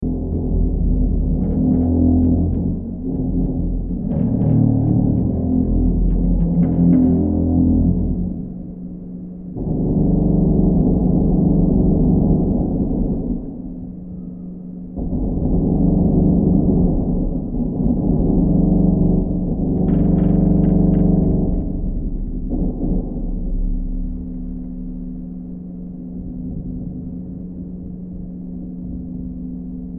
Soundscapes > Synthetic / Artificial
Looppelganger #152 | Dark Ambient Sound
Use this as background to some creepy or horror content.
Hill, Sci-fi, Horror, Noise, Soundtrack, Ambience, Survival